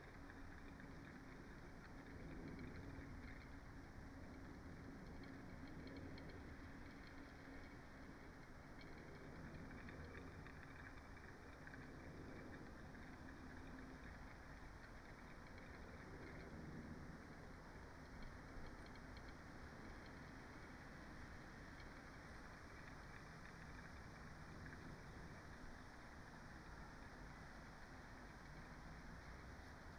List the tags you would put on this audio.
Soundscapes > Nature
Dendrophone
soundscape
artistic-intervention

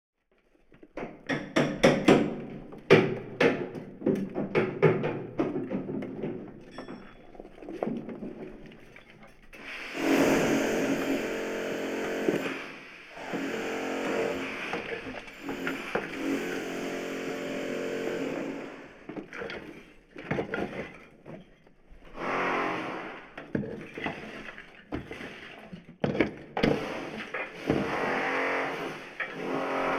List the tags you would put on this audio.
Soundscapes > Indoors
Work
Workers

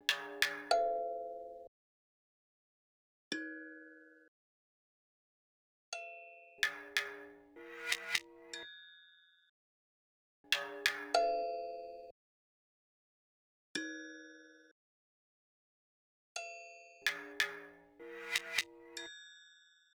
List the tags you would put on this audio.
Instrument samples > Percussion
sticks
mbira
sounds
natural
tribal
perc
1lovewav
percs

